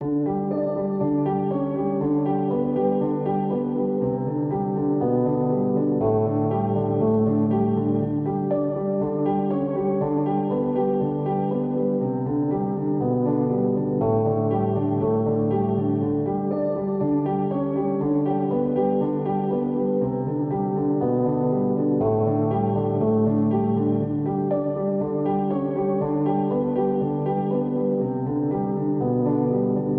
Music > Solo instrument
Piano loops 059 efect 4 octave long loop 120 bpm
reverb, loop, 120, simplesamples, pianomusic, piano, simple, samples, music, free, 120bpm